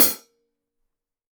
Music > Solo instrument
Vintage Custom 14 inch Hi Hat-002
Custom, Cymbal, Cymbals, Drum, Drums, Hat, Hats, HiHat, Kit, Metal, Oneshot, Perc, Percussion, Vintage